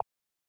Sound effects > Objects / House appliances
Drawing a dot on notebook paper with an ink fountain pen, recorded with an AKG C414 XLII microphone.